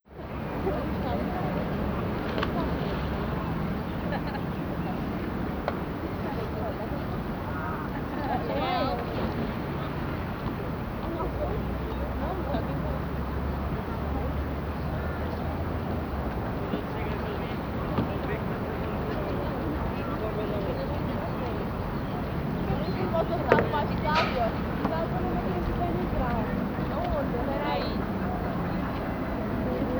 Soundscapes > Urban
070 PEDROINESBRIDGE FARAWAY-TRAFFIC PEOPLE CONSTRUCTION-NOISES
Sounds recorded between Jardim Botânico and Convento de São Francisco (Coimbra, Portugal, 2018). Recorded with Zoom H4n mk1, using either built-in mics, Røde shotgun (I forgot which model...) and different hydrophones built by Henrique Fernandes from Sonoscopia.